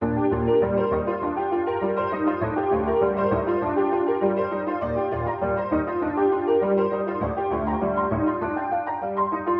Music > Solo instrument
Piano loop Groovy 120bpm
Created in fl studio use for anything this is from a track I abandoned
piano, dance, 120bpm, loop, groove, keyboard